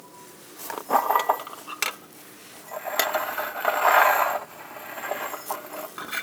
Sound effects > Objects / House appliances
mug cup foley
foley perc sfx fx percussion sample field recording
sample, fx, recording, sfx, foley, percussion, field, perc